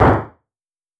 Sound effects > Human sounds and actions
Footstep Gravel Running-02
Shoes on gravel, running. Lo-fi. Foley emulation using wavetable synthesis.